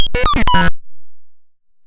Sound effects > Electronic / Design
Optical Theremin 6 Osc dry-078
Sweep, Scifi, FX, Trippy, Robot, Electro, Noise, Otherworldly, Synth, Robotic, Handmadeelectronic, Glitch, Experimental, Digital, noisey, Alien, SFX, Bass, Analog, Theremins, Dub, DIY, Instrument, Theremin, Optical, Sci-fi, Spacey, Electronic, Infiltrator, Glitchy